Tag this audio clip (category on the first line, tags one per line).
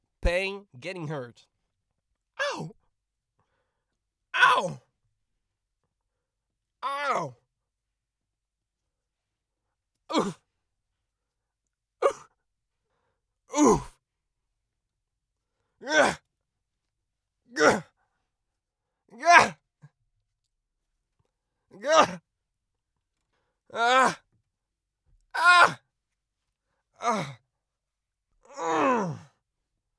Speech > Solo speech
2025
20s
A2WS
Adult
August
aww
Cardioid
Dude
English-language
France
FR-AV2
hurt
In-vehicle
kit
Male
mid-20s
Mono
ouch
pack
pain
RAW
Single-mic-mono
SM57
Surfer
Tascam
VA
Voice-acting